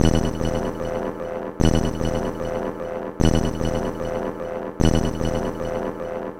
Instrument samples > Percussion
This 150bpm Drum Loop is good for composing Industrial/Electronic/Ambient songs or using as soundtrack to a sci-fi/suspense/horror indie game or short film.
Alien, Ambient, Dark, Drum, Industrial, Loop, Loopable, Packs, Samples, Soundtrack, Weird